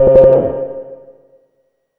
Instrument samples > Synths / Electronic
Benjolon 1 shot17
CHIRP, BENJOLIN, DRUM, NOISE